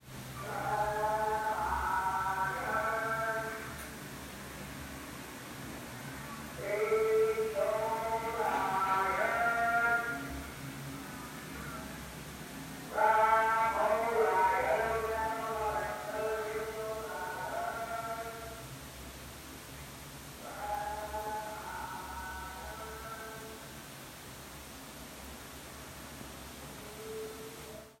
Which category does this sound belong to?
Soundscapes > Urban